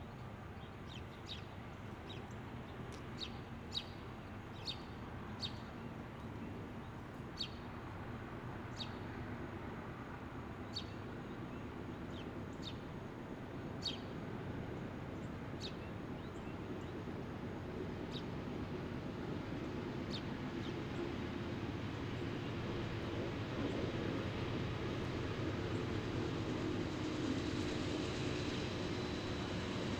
Soundscapes > Other
Field recording of a passing CSX intermodal train in Ridgefield Park, NJ. Recorded with a Zoom H9 Essential recorder. Audio edited in AVS Audio Editor.